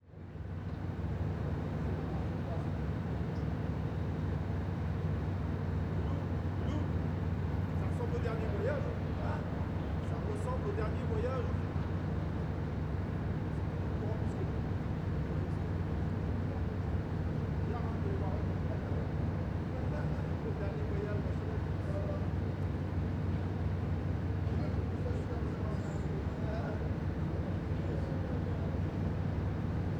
Soundscapes > Urban
Saint Nazaire motor boat voices
2 men on a small boat working in Saint Nazaire.
ambiance, dock, Saint-Nazaire, ship, work, boat, people, voices, engine, harbour, ambience, men, field-recording, city, docks, port